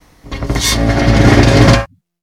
Sound effects > Objects / House appliances
old contraption 1
• Audacity → View → Toolbars → Device Toolbar • select the Audio Host (Windows WASAPI) • choose the correct recording device (Loopback Option) For example, you might see: "Speakers (Realtek High Definition Audio) (loopback)"
screeching, chime, grating, belt, rasping, crash, ring, toll, clanging, peal, clash, clank, clanking, shrieking, grinding, clashing, bong, jangling, machine, creaking, reverberate, contraption, boing, old, resound, clang, scraping